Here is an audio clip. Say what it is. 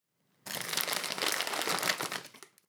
Sound effects > Other
FOODEat Cinematis RandomFoleyVol2 CrunchyBites Food.Bag Bruschetta Rustle Short Freebie
rustle, recording, SFX, bite, bites, effects, foley, texture, design, sound, handling, plastic, snack, bag, crunch, postproduction, crunchy, food, bruschetta